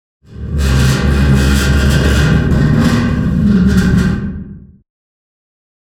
Sound effects > Other
Raw Industrial Recordings-Scratching Metal 005
Audio recorded by me. Field recording equipment: Tascam Portacapture x8 and microphone: RØDE NTG5. Raw recording file, basic editing in Reaper 7.
metallic, cinematic, rusted, experimental, grungy, sfx, sounds, scraping, scratching, found, sound, harsh, textures, effects, mechanical, distorted, drone, rust, noise, impact, metal, abstract, clang, industrial, raw, foley